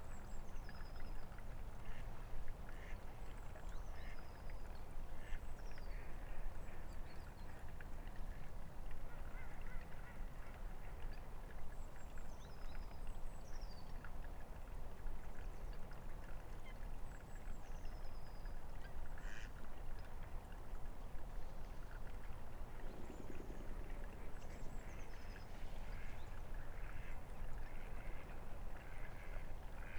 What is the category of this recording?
Soundscapes > Urban